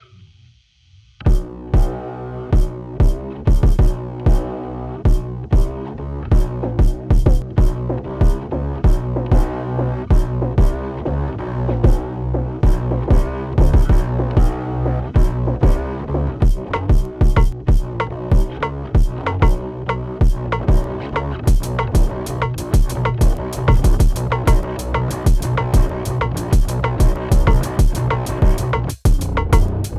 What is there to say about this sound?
Music > Multiple instruments
95bpm dnb
95 bpm drum and bass, worked in Logic. Bass is Tagima Jb5, elctro-harmonix big muff, through a fender rumble 100, into a sad 2i2 focusrite. Gonna make more out of it, but would love ideas and collaboration